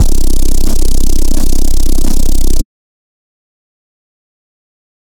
Instrument samples > Synths / Electronic
Deep Pads and Ambient Tones30
synthetic, Dark, bass, Pads, Chill, Oneshot, bassy, Analog, Deep, Tone, Ambient, Ominous, Note, Synthesizer, Haunting, Tones, Synth, Digital, Pad